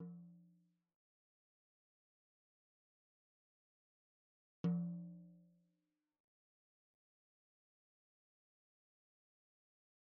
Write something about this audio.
Music > Solo percussion
Hi Tom- Oneshots - 16- 10 inch by 8 inch Sonor Force 3007 Maple Rack
Hi-Tom recording made with a Sonor Force 3007 10 x 8 inch Hi-Tom in the campus recording studio of Calpoly Humboldt. Recorded with a Beta58 as well as SM57 in Logic and mixed and lightly processed in Reaper